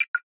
Sound effects > Electronic / Design
Random UI Sounds 6
All sample used from bandlab. I just put a drumfill into phaseplant granular, and used Flsudio ''Patcher'' plugin to add multiple phaser, Vocodex, and flanger, because I was really boring. Extra plugin used to process: OTT.
UI, Effect, Select, Game